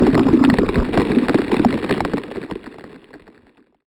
Sound effects > Electronic / Design
A Deep Ice Cracking inside of ice Glaciers designed with Pigments via studio One